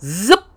Sound effects > Objects / House appliances
TOONZip-Blue Snowball Microphone, CU Vocal Nicholas Judy TDC
A vocal zip.
Blue-brand, Blue-Snowball, cartoon, vocal, zip